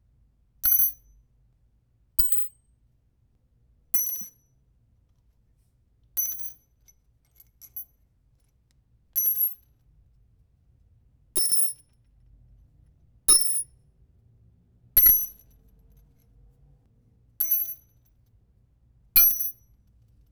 Sound effects > Objects / House appliances
item drop nails individually on cold floor
Dropping large nails individually on a cold floor. Recorded with Zoom H2.
cold, drop, impact, floor